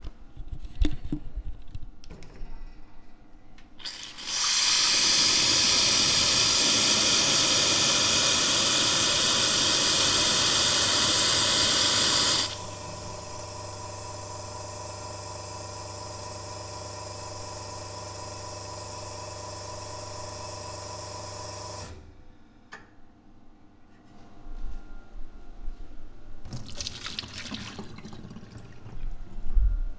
Sound effects > Objects / House appliances
Coffee Machine, cup rinse, boiler hum, steam wand purge
Coffee machine, steam wand purge, cup set on grill, Hot water running, boiler humming, cup empty down drain. Own recording Zoom H1n.
barista, brewing, cafe, coffee, espresso, maker